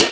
Instrument samples > Percussion
hi-hat trigger 1
Bosporus, brass, bronze, chick-cymbals, closed-cymbals, closed-hat, crisp, cymbal, cymbal-pedal, cymbals, drum, drums, facing-cymbals, hat, hat-set, hi-hat, impact, Istanbul, Meinl, metal, metallic, microcymbal, minicymbal, Paiste, percussion, picocymbal, Sabian, snappy-hats, trigger, Zildjian